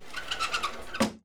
Sound effects > Objects / House appliances
A dual-part sound effect of a sliding door. The amplitude of the initial slide and the concluding thud have been normalized to create an evenly leveled sound event.